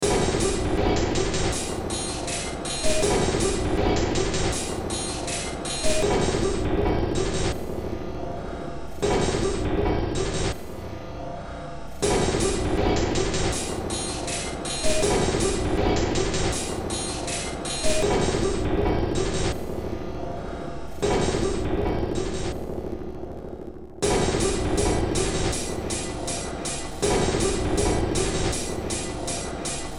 Music > Multiple instruments
Short Track #3062 (Industraumatic)
Ambient, Cyberpunk, Games, Horror, Industrial, Noise, Sci-fi, Soundtrack, Underground